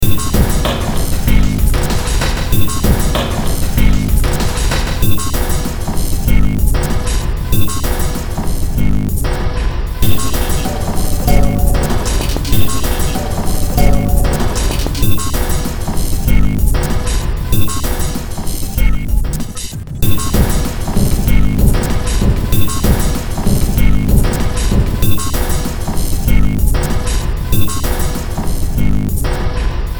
Music > Multiple instruments
Short Track #3692 (Industraumatic)
Sci-fi, Games, Ambient, Noise, Horror, Soundtrack, Cyberpunk, Underground, Industrial